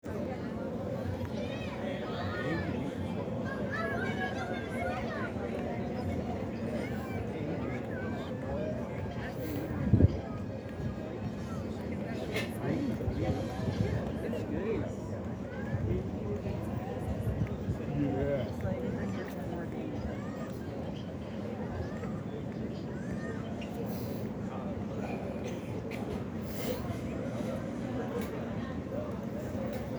Soundscapes > Urban
Recorded in late-August 2023 while waiting outside of Clancy's By The Sea on the boardwalk of Ocean City, New Jersey.